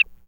Sound effects > Experimental
Analog Bass, Sweeps, and FX-013
mechanical, vintage, oneshot, electronic, complex, synth, electro, sample, bassy, sci-fi, retro, basses, weird, sfx, alien, pad, machine, trippy, analog, robot, bass, dark, analogue, scifi, snythesizer, korg, robotic, fx, effect, sweep